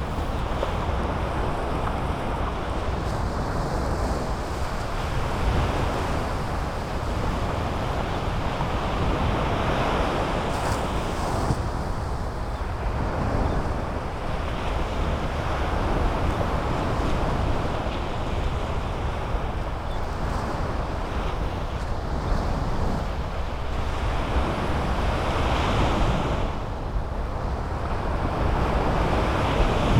Soundscapes > Nature
WATRSurf-Gulf of Mexico Sunrise at Perdido Pass, Medium Size Breaking Waves QCF Gulf Shores Alabama Sony M10
Sunrise at Perdido Pass Beach, 40 feet from Shore, early morning surf, breaking waves, medium surf.
beach, seaside, surf